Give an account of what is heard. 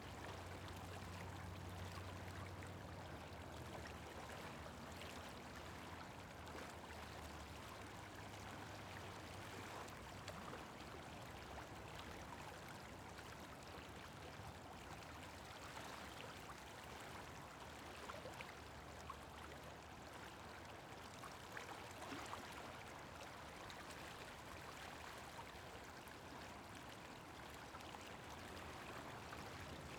Soundscapes > Nature
Sound of current in the Mapocho River, Chile.
Recording made in Chile, Santiago, on the Mapocho River, near the airport, at 6:00 pm, recorded with Zoom H6.